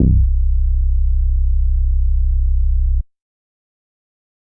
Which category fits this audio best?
Instrument samples > Synths / Electronic